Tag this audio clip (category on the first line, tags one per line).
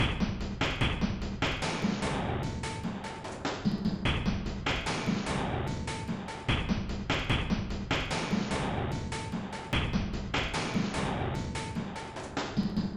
Instrument samples > Percussion
Samples
Packs
Loop
Alien
Dark
Soundtrack
Weird
Loopable
Ambient
Industrial
Underground
Drum